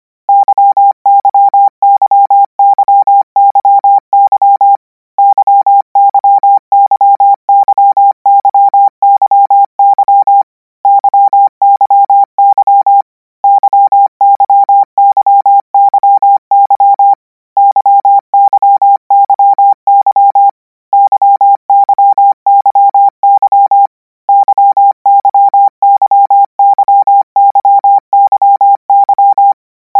Sound effects > Electronic / Design
Koch 19 Y - 200 N 25WPM 800Hz 90%
Practice hear letter 'Y' use Koch method (practice each letter, symbol, letter separate than combine), 200 word random length, 25 word/minute, 800 Hz, 90% volume.
radio, letters